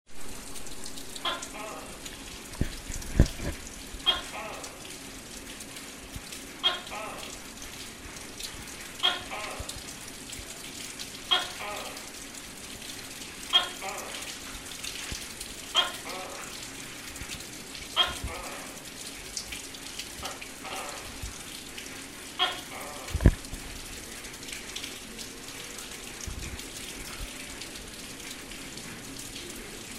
Soundscapes > Nature
frog in the rain, Cambodia
I recorded this outside of my window at a hotel in Siem Reap, Cambodia, in 2015 (recorded while laid up incredibly sick with my partner on our honeymoon).
rain, ambient, field-recording, nature, frog, wilderness